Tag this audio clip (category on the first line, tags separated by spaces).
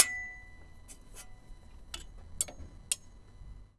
Sound effects > Objects / House appliances
Ambience Robot Foley scrape Clank Robotic Metallic Junkyard FX Percussion Perc dumpster Environment Smash Machine Junk Bang Metal waste Bash rubbish tube dumping trash SFX Clang rattle garbage Atmosphere Dump